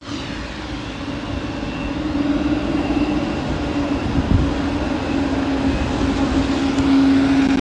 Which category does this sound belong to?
Sound effects > Vehicles